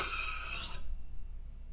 Sound effects > Objects / House appliances
knife and metal beam vibrations clicks dings and sfx-128
a collection of sounds made with metal beams, knives and utensils vibrating and clanging recorded with tascam field recorder and mixed in reaper
Beam, Clang, ding, Foley, FX, Klang, Metal, metallic, Perc, SFX, ting, Trippy, Vibrate, Vibration, Wobble